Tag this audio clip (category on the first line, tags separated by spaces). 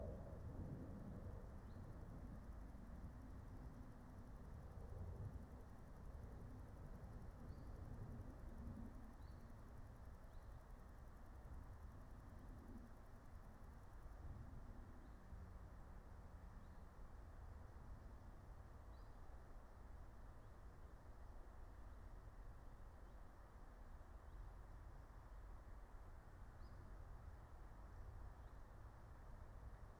Soundscapes > Nature
phenological-recording field-recording natural-soundscape soundscape meadow alice-holt-forest nature raspberry-pi